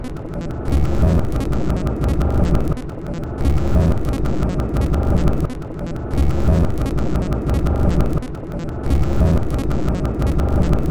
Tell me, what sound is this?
Instrument samples > Percussion
This 176bpm Drum Loop is good for composing Industrial/Electronic/Ambient songs or using as soundtrack to a sci-fi/suspense/horror indie game or short film.
Ambient, Alien, Drum, Loop, Samples, Dark, Soundtrack, Weird, Industrial, Loopable, Packs, Underground